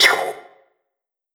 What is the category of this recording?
Sound effects > Electronic / Design